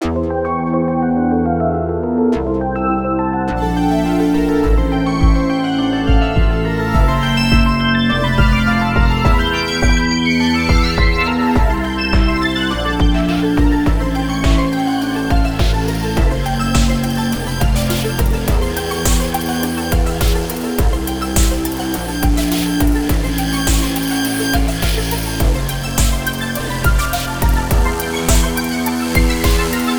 Music > Multiple instruments
darkwave distopian beat
a dark evolving bassy industrial beat created with FL Studio, Phase Plant, and Reaper
bass, bassy, beat, club, crunchy, dance, dark, darkwave, distorted, drum, drumbeat, drumloop, drums, edmo, electro, electronic, evolving, hard, industrial, keyloop, keys, loop, loopable, melody, minor, sinister, spooky, techno, trance